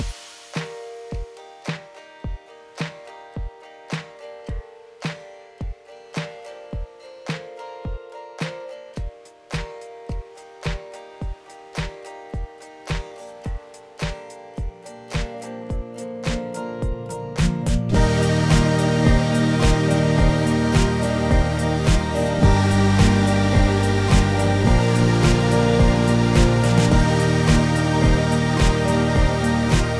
Music > Multiple instruments
Wonders [cinematic background music][107 bpm]
In this track I mixed acoustic guitar, drum samples, digital synths, and some string samples to create a very cinematic sounding backing track. The music was made in Ableton Live 12 and the primary instruments were my Martin X acoustic guitar and my Kurzweiler Artis keyboard. The keyboard was wired into my Yamaha MG10XU mixer and the guitar was captured with my audio-technica at2035 microphone. The track was made to compel a sense of cheer, wonder, and awe.